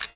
Sound effects > Objects / House appliances
COMCam-Blue Snowball Microphone, CU Fuji Instax Mini 9 Camera, Shutter Click, Flash Only Nicholas Judy TDC
A Fuji Instax Mini 9 camera shutter click. Flash only.
Blue-brand, Blue-Snowball, camera, click, flash, fuji-instax-mini-9, only, shutter